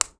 Sound effects > Objects / House appliances
FOLYMisc-Blue Snowball Microphone, CU Snap, Candy Cane Nicholas Judy TDC
A candy cane snap.
Blue-Snowball, candy-cane, foley